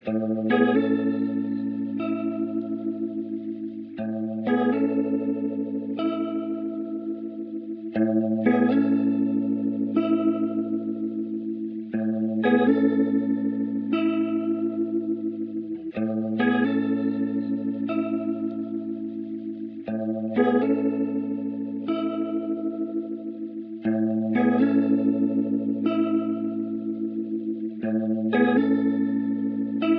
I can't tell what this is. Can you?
Music > Solo instrument
Guitar loops 126 04 verison 04 60.4 bpm
Guitar loop played on a cheap guitar. This sound can be combined with other sounds in the pack. Otherwise, it is well usable up to 4/4 60.4 bpm.
electricguitar, free, guitar, music, loop, simplesamples, samples, electric